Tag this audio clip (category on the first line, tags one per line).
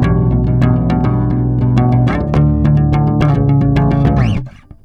Instrument samples > String
bass
blues
charvel
electric
funk
loop
mellow
oneshots
riffs
rock
slide